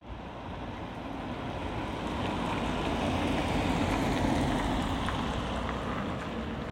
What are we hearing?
Soundscapes > Urban
Driving by cars recorded in an urban area.